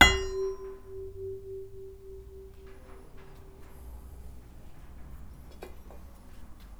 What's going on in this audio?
Sound effects > Other mechanisms, engines, machines
metal shop foley -036
thud, bam, foley, knock, wood, perc, sound, tools, boom, pop, metal, bop, shop, crackle, sfx, fx, rustle, oneshot, little, bang, percussion, tink, strike